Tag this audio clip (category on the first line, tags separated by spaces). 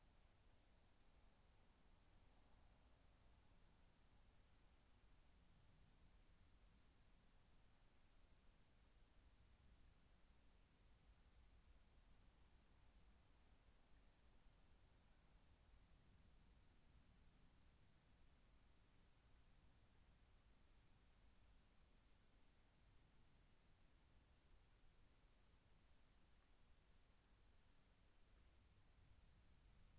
Soundscapes > Nature
raspberry-pi weather-data nature alice-holt-forest data-to-sound Dendrophone soundscape field-recording phenological-recording modified-soundscape sound-installation artistic-intervention natural-soundscape